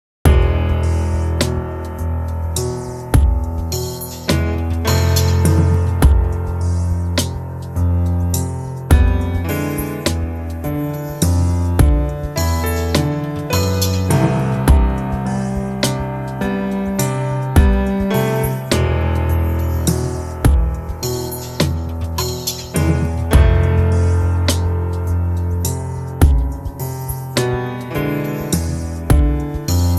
Music > Multiple instruments
A piano melody beat loop I made in my studio on FL Studio using Pigments, Kontakt, FabFilter and additional processing via Reaper